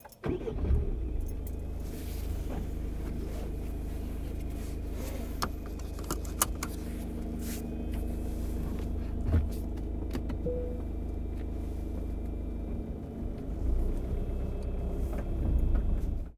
Soundscapes > Other
A short recording of the interior of a Citreon Berlingo van starting up, seat belt being put on and slowly moving off.
belt, driving, engine, interior, recording, seat, start, van